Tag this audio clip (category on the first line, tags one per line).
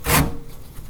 Sound effects > Other mechanisms, engines, machines
twangy
shop
tool
handsaw
saw
plank
foley
perc
twang
vibration
metallic
vibe
fx
percussion
metal
sfx
smack
hit
household